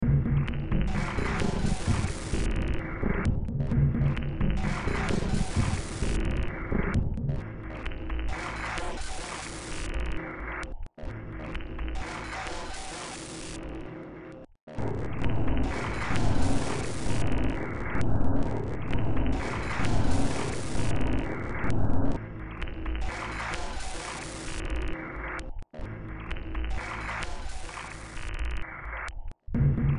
Music > Multiple instruments
Short Track #3389 (Industraumatic)
Ambient, Cyberpunk, Games, Horror, Industrial, Noise, Sci-fi, Soundtrack, Underground